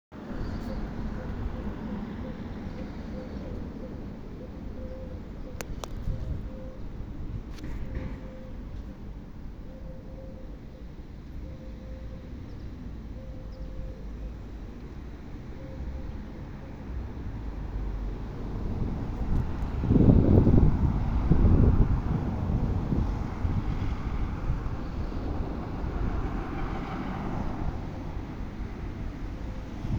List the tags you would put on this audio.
Soundscapes > Urban
atmophere
field
recording

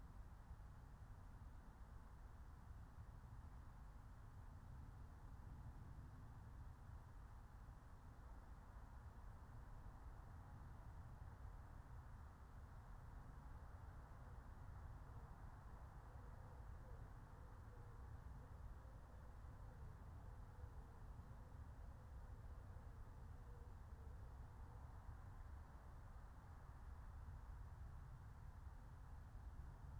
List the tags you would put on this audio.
Soundscapes > Nature
field-recording
soundscape
alice-holt-forest
meadow
raspberry-pi
natural-soundscape

